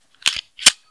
Sound effects > Other mechanisms, engines, machines
CZ P-10C slide
This sounds is my CZ P-10C having the slide pulled back and returning to it's resting position.
9mm, cock, cz, gun, handgun, pistol